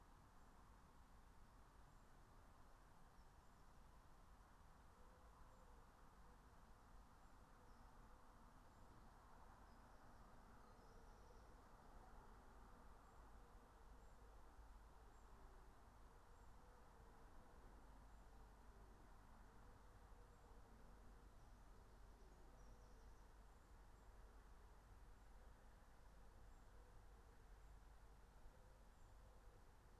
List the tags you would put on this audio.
Soundscapes > Nature
alice-holt-forest raspberry-pi phenological-recording soundscape meadow nature natural-soundscape field-recording

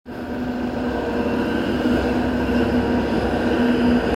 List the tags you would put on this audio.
Sound effects > Vehicles
city public-transport tram

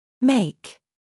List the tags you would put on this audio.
Speech > Solo speech
word voice english

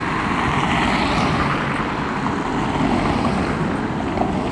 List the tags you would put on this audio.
Soundscapes > Urban
car city driving tyres

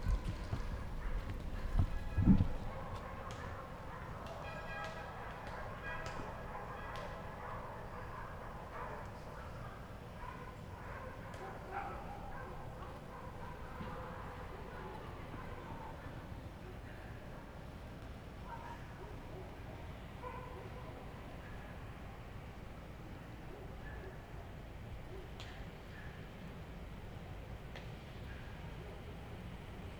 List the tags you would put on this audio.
Soundscapes > Urban
bark night neighborhood dogs barking city

